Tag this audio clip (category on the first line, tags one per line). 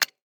Sound effects > Human sounds and actions
activation
button
click
interface
off
switch
toggle